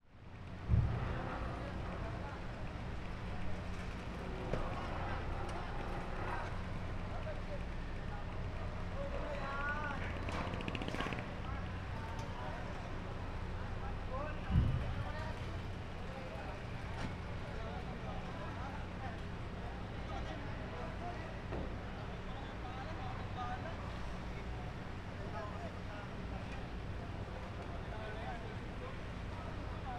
Soundscapes > Other
250801 192132 PH Ferry boat loading then leaving
Ferryboat loading, then leaving. I made this recording in the harbour of Calapan city (Oriental Mindoro, Philippines), from the deck of a ferryboat. One can hear the vehicles (cars, motorcycles and trucks) loading the ship while people are talking and shouting on the pier. When finished, the gates of the ship are activated (at #9:59) and closed, while the horn can be heard at #10:17 I really like this last part ! ;-) Recorded in August 2025 with a Zoom H5studio (built-in XY microphones). Fade in/out applied in Audacity.
harbour, motorcycles, squeak, vehicles, boom, Philippines, ferry, machinery, pier, load, heavy, noisy, port, engine, ship, field-recording, squeaking, loud, machine, Calapan-city, ferryboat, cars, voices, trucks, loading, atmosphere, bang, noise, boat, siren